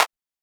Instrument samples > Percussion
Hi ! Game Designers! I can't wait to see that how cool is it~! And, I synth it with phasephant!